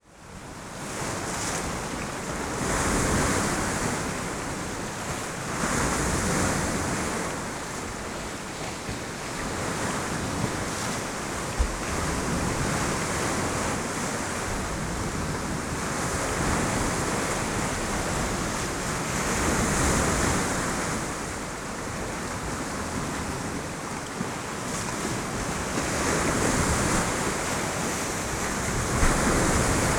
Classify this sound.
Soundscapes > Nature